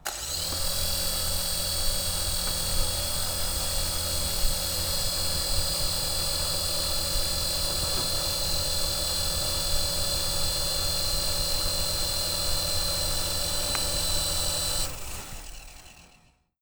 Sound effects > Objects / House appliances
AERORadio-Blue Snowball Microphone, CU Helicopter, RC, Start, Hover, Stop Nicholas Judy TDC
An RC helicopter starting, hovering and stop.